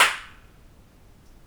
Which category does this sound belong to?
Sound effects > Other